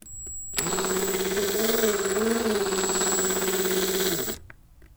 Sound effects > Other mechanisms, engines, machines
Milwaukee impact driver foley-003
Tool, Workshop, Impact, Motor, Drill, Household, sfx, Tools, Metallic, fx, Foley, Scrape, Shop, Woodshop